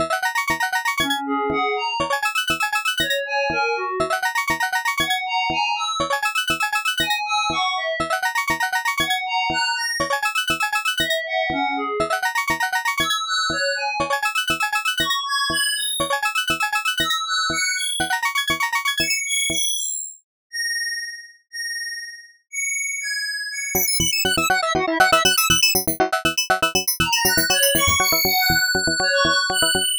Music > Multiple instruments
Thế Giới Banh: Tập 2
background, computer, electronic, fm-synthesis, loop, music